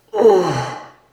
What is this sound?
Sound effects > Human sounds and actions
Hurt sound / Man moaning
I got hit by a basketball and recorded my reaction.
2013, edited, hurt, Man, Moan, ouch, Recorded, stacked